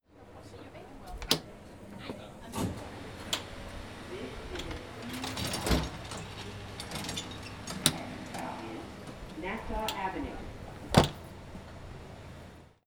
Soundscapes > Urban
between trains still
Openning door between trains in NYC, rattle of chains, door closing. Recorded using a Zoom H4n Pro.
train, subway, mta